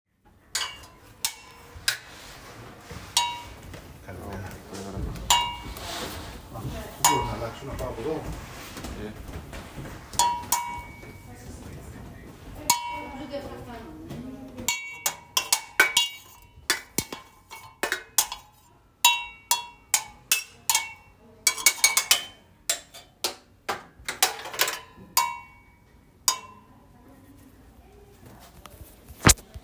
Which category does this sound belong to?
Sound effects > Human sounds and actions